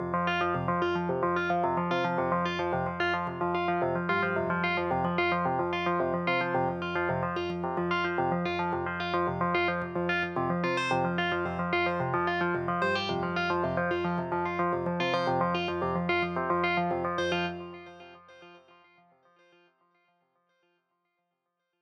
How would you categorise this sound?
Music > Solo instrument